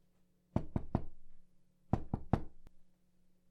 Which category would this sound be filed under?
Sound effects > Vehicles